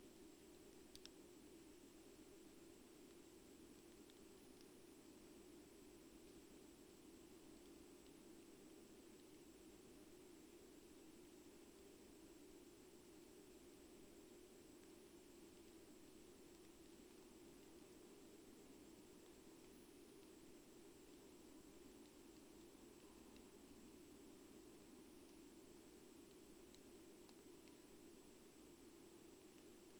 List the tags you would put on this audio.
Soundscapes > Nature
alice-holt-forest phenological-recording raspberry-pi natural-soundscape weather-data field-recording modified-soundscape artistic-intervention nature sound-installation soundscape Dendrophone data-to-sound

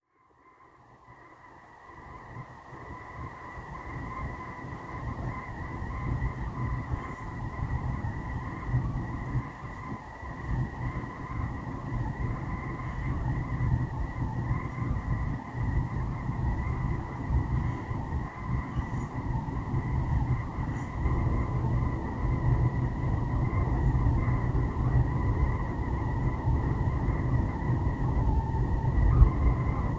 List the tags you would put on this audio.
Sound effects > Other mechanisms, engines, machines
air whistle

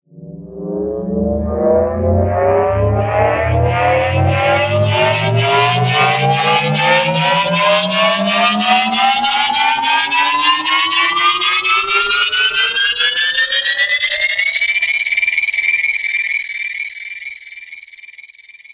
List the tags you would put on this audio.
Sound effects > Electronic / Design
Powerup Riser Sci-Fi Sweep Synthetic

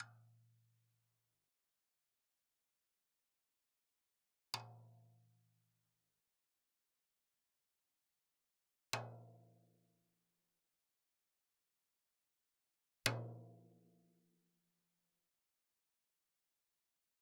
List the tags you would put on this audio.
Music > Solo percussion
wood maple recording kit realdrum tomdrum Tom toms oneshot Medium-Tom drums perc flam loop beat real roll percussion drumkit drum med-tom acoustic quality

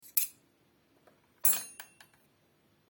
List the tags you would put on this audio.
Sound effects > Objects / House appliances
knife metal spoon rummaging cutlery kitchen fork cook

